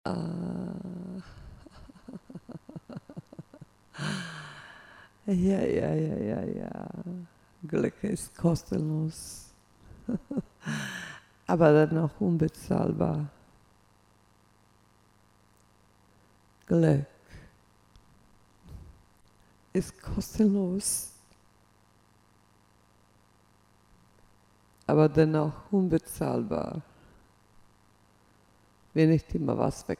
Speech > Solo speech
Glück ist unbezahlbar
speak, female